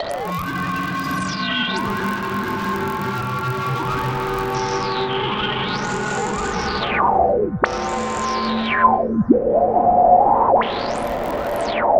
Sound effects > Electronic / Design
Roil Down The Drain 5
dark-techno, mystery, vst, dark-soundscapes, scifi, sound-design, noise, content-creator, cinematic, horror, PPG-Wave, drowning, sci-fi, dark-design, noise-ambient, science-fiction